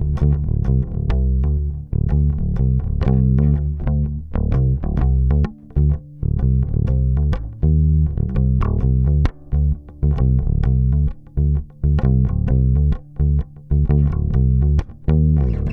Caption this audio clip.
Music > Solo instrument
blues rock riff 6
bass, basslines, blues, chords, chuny, electric, funk, fuzz, harmonics, low, lowend, notes, pick, pluck, riff, riffs, rock, slides